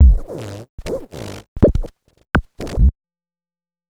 Sound effects > Electronic / Design
NNC sherm-noize 01
Electronic blips n yips from Sherman filterbank
drum, electronic, glitch, idm, kit, noise